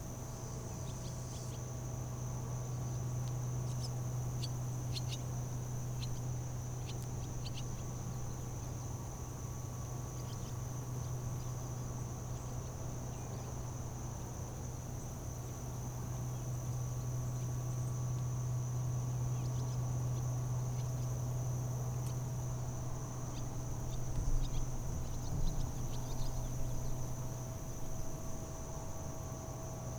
Soundscapes > Urban
AMBSea-Summer The quiet distant shore of Lake Shelby, distant traffic, AC hum, 630AM QCF Gulf Shores Alabama Zoom H3VR
The far side, north shore of Lake Shelby, Gulf Shores, Alabama, 6:30AM - distant traffic, wind, jumping fish, crickets, frogs, AC hum from nearby cabins.
jumping-fish, field-recording, nature, summer, birds, distant-traffic, lake, morning